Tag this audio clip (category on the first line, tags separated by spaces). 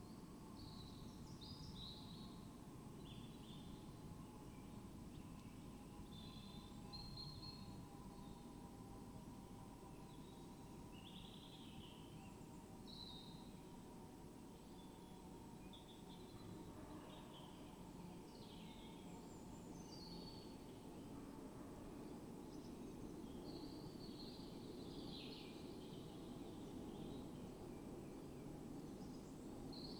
Soundscapes > Nature
alice-holt-forest sound-installation field-recording Dendrophone soundscape nature data-to-sound natural-soundscape artistic-intervention weather-data raspberry-pi modified-soundscape phenological-recording